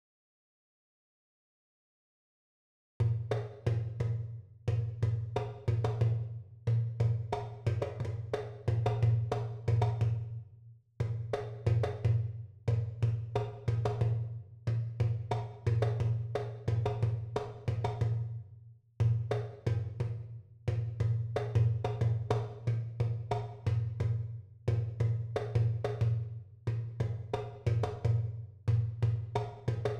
Music > Solo percussion
Yoruba Percussion (Batà) 120 bpm
bata, percussion